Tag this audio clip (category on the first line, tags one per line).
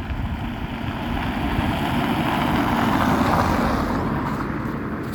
Sound effects > Vehicles
passing-by
moderate-speed
car
wet-road
asphalt-road
studded-tires